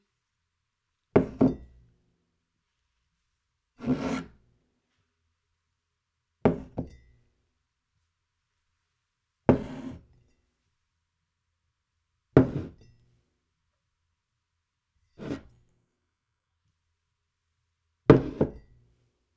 Sound effects > Objects / House appliances
Mug On Table: Setting Down and Picking Up
Setting down and picking up a ceramic mug from a wooden table. It could be a first date at a cafe or an intense business meeting. You're welcome! Recorded on Zoom H6 and Rode Audio Technica Shotgun Mic.
coffee, mug, tea, office, cafe, table